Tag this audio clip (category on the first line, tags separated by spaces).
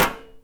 Sound effects > Other mechanisms, engines, machines
foley household metal metallic perc saw sfx smack tool twangy